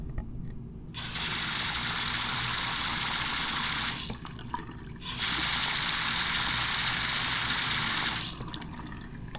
Sound effects > Objects / House appliances
Running Water
Water, Running, Liquid